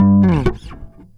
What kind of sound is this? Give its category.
Instrument samples > String